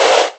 Instrument samples > Percussion
A fake crash based on EQed and chorused low-pitched white noise. I applied 200 ㎐ high pass and 5 k㎐ low pass. I also boosted and removed many parts of the acoustic frequency (sound) spectrum.